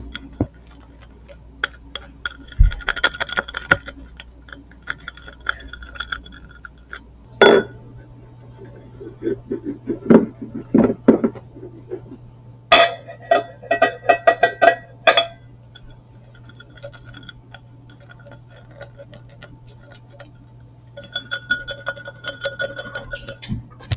Sound effects > Objects / House appliances
Glass Scratching Sounds
Scratching a tall fancy (not a wine glass) glass cup sounds 0:00 Scratching the glass with my nails 0:07 I put the glass on my wooden tv stand 0:08 I rub the glass against my wooden tv stand 0:13 I rub the glass against a vase on my tv stand 0:17 Scratching the glass with my nails 0:23 ending the recording (sounds like a camera shot?) Original
scratching-glass, scratchingglass, glass-scratching